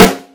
Instrument samples > Percussion
A&F Drum Co. 5.5x14 Steam Bent Solid Maple Shell Whisky Field Snare 4
abrasive, active-snare, bass-snare, coarse, death-metal, deathsnare, deepsnare, doom, doomdeath, doom-metal, doomsnare, drum, grating, gravelly, harsh, hoarse, main-snare, mainsnare, metal, percussion, rock, rough, roughsnare, scratchy, snare, snared-drum, strike, thrash-metal, timpano